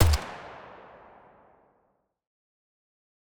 Sound effects > Electronic / Design
A rifle firing one-shot designed SFX created with Krotos's Weaponiser. Stereo. 96Khs.
riflle, one-shot, fire, gun, weapon